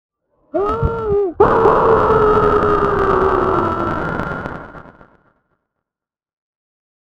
Sound effects > Human sounds and actions
muffled scream
a scream i made with added echo and muffle
pain, scream, yell